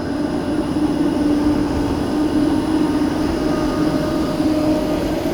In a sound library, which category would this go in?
Sound effects > Vehicles